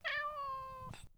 Sound effects > Animals
Mavis cat-meow-soft-whine 01
Soft, whiny cat vocalization.
cat, soft, meow, feline